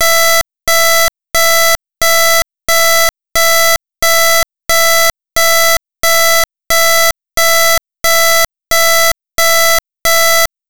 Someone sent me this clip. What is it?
Sound effects > Electronic / Design
alarm loop
emergency, loop